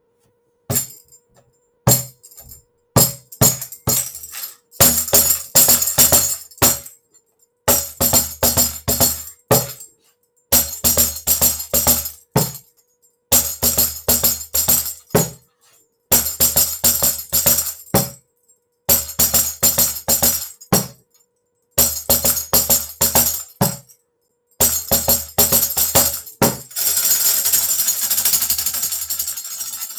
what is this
Instrument samples > Percussion
A piece by Alex's rock-tambourine
Alex plays the tambourine. Use for your music pieces, songs, compositions, musicals, operas, games, apps